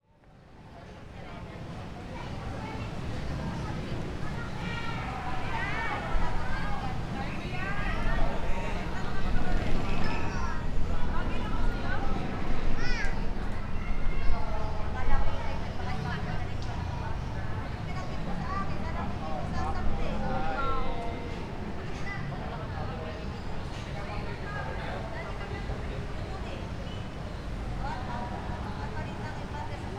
Soundscapes > Urban
250730 165533 PH Loud siren in Calapan
Loud warning siren in Calapan city at 5PM. Everyday at 7AM, 12PM and 5PM, people living in Calapan city (Oriental Mindoro, Philippines) can hear the loud siren audible at #0:30. As I recorded it as 5PM, one can also hear students chatting and playing in the suroundings, some distant street loudspeakers, and quite heavy traffic in the town. Recorded in July 2025 with a Zoom H5studio (built-in XY microphones). Fade in/out applied in Audacity.
Calapan-city, typhoon, urban, city, soundscape, people, military, danger, noisy, fire, alert, Philippines, field-recording, bomb, traffic, vehicles, defence, atmosphere, town, voices, ambience, disaster, alarm, civil, siren, hurricane, loud, tornado